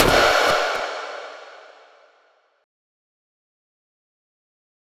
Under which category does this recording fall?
Sound effects > Experimental